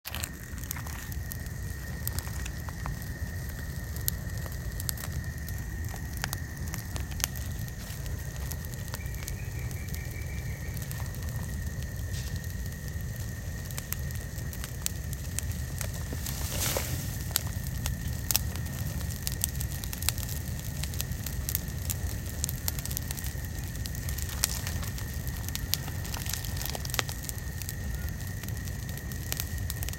Soundscapes > Nature
Campfire 1 - Australian Bush
Outdoor campfire recorded on iphone 14 in Australian bush. Crackle and flame sounds of mostly burning leaves and kindling. Some footsteps (approx #00:28-00:40), more steps and spraying of insect repellent with slight cough (male) (approx #1:35-2:13), footsteps on leaves (#3:00-3:24), more steps plus raking of leaves (from #4:06-end).
fire, Australia, bush, Insects, Birds, Footsteps, nature, Campfire, flames, raking, crackling, outdoor, ambience, field-recording